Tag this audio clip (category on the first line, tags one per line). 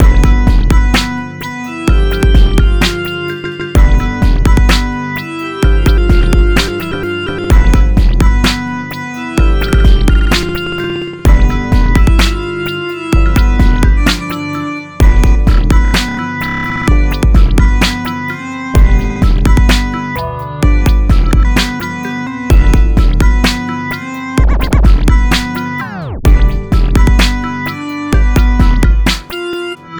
Music > Multiple instruments
fantasy
trippy
electronic
music
cheesy
trip
chill
groovy
loopable
rhythm
dance
glitchy
videogame
glitch
mellow
perc
kit
composition
hop